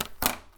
Sound effects > Other mechanisms, engines, machines
metal shop foley -152
crackle, pop, oneshot, perc, fx, tools, sound, shop, foley, sfx, percussion, knock, metal, strike, bop, bang, bam, wood, tink, boom, thud, rustle, little